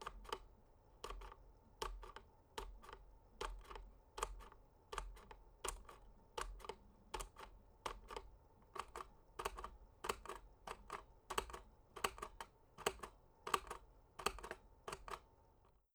Sound effects > Objects / House appliances
GAMEMisc-Blue Snowball Microphone Desktop Pinball, Flipper Movements Nicholas Judy TDC
Desktop pinball flipper movements.